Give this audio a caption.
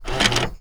Sound effects > Vehicles
Ford 115 T350 - Back door lock
115, 2003, 2003-model, 2025, A2WS, August, Ford, Ford-Transit, France, FR-AV2, Mono, Old, Single-mic-mono, SM57, T350, Tascam, Van, Vehicle